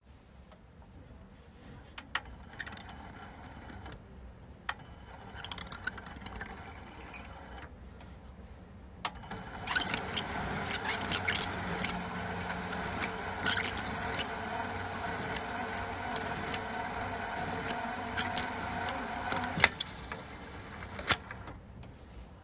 Sound effects > Other mechanisms, engines, machines
All sounds associated with a 35mm plastic microfilm scanner being loaded with microfilm, cranked, fast-forwarded and rewound. Actual research of vintage newspapers at a local library being performed for the recording.